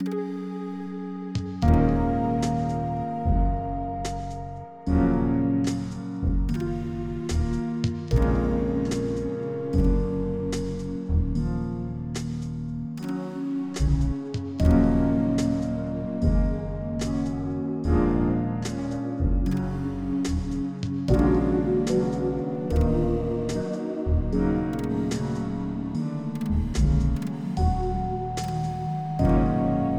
Music > Other
Wasn't Meant to Be (dark jazz, noir)
A melancholic noir track for my game that you can use for yours, or something.
dark, jazz, moody, noir